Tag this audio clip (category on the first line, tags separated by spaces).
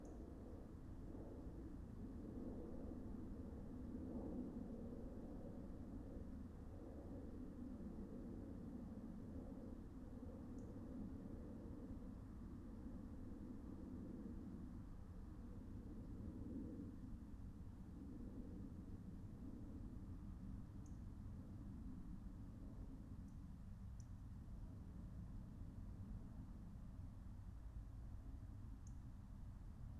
Soundscapes > Nature
soundscape meadow nature natural-soundscape alice-holt-forest raspberry-pi field-recording phenological-recording